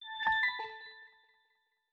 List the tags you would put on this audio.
Sound effects > Electronic / Design
minimalist
power

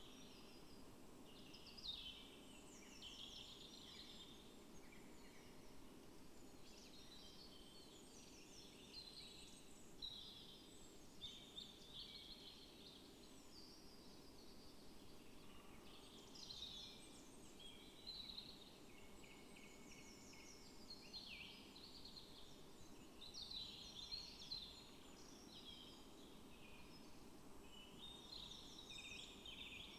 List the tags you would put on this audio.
Soundscapes > Nature
Dendrophone
field-recording
modified-soundscape
natural-soundscape
phenological-recording
sound-installation

